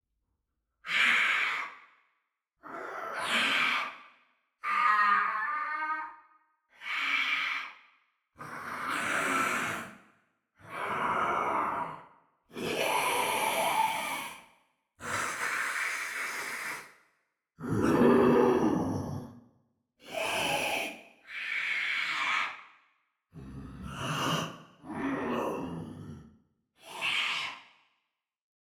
Sound effects > Other
Demons or moster sounds
Sounds for your next monster, such as a demon or zombie, for your scary game or movie. These sounds have snarls, growls, and screams of the creatures.
creature, growl, horror, monster, roar, scary